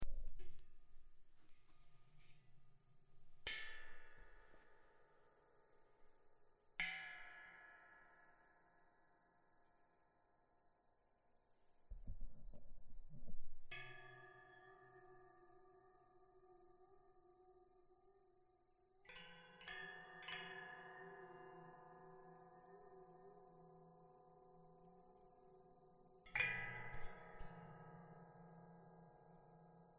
Sound effects > Other
waterphone tapping Jan172026
One minute of tapping sounds on the Waterphone. The Standard model, (e.g. not the "Whaler") built and signed in Hawaii by the patent-holder Richard A. Waters, the year 2000. Patent no. 3896696 Recording with standard mics on the Zoom H6.
waterphone, ambient, percussion